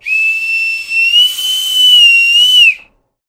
Sound effects > Objects / House appliances
WHSTMech-Blue Snowball Microphone, CU Boatswain Pipes, Secure or Pipedown Nicholas Judy TDC
A bosun whistle - Secure or pipe-down.
Blue-brand, whistle